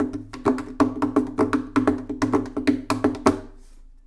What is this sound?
Music > Solo instrument
acoustic guitar tap 2
acosutic, chord, chords, dissonant, guitar, instrument, knock, pretty, riff, slap, solo, string, strings, twang